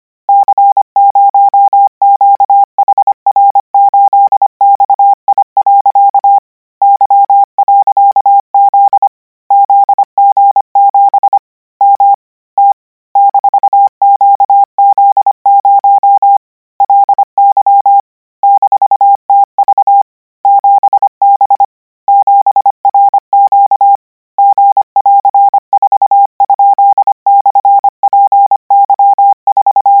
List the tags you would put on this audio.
Sound effects > Electronic / Design
radio
characters
code
codigo
morse

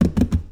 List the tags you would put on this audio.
Sound effects > Objects / House appliances
object
plastic
household
carry
tool
foley
tip
slam
debris
water
lid
liquid
pail
clang
spill
kitchen
garden
bucket
shake
pour
hollow
metal
fill
handle
knock
cleaning
container
clatter
scoop
drop

